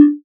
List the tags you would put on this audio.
Instrument samples > Synths / Electronic
additive-synthesis fm-synthesis

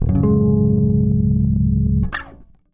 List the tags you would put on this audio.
Music > Solo instrument
electric pick harmonics slides funk harmonic riff lowend riffs bassline basslines chuny electricbass pluck slide rock slap chords fuzz blues bass notes low note

